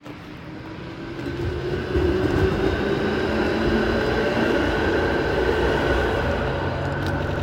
Soundscapes > Urban
Tram passing Recording 31
Train, Rail